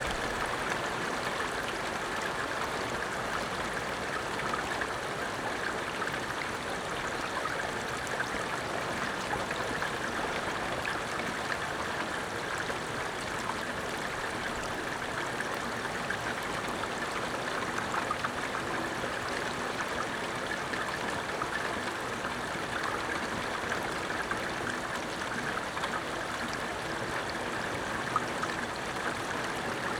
Soundscapes > Nature
Rain falling combined with water running on the ground, steady outdoor ambience.
ambience, outdoor, rain, running, storm, water, weather, wet